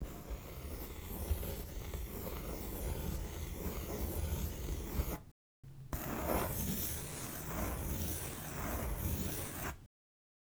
Sound effects > Objects / House appliances
Pencil scribble slow long
Pencil scribbles/draws/writes/strokes slowly for a long amount of time.
pencil, draw